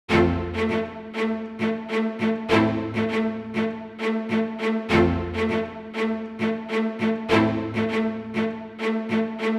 Music > Solo instrument
A violin melody with tension wanting to explode.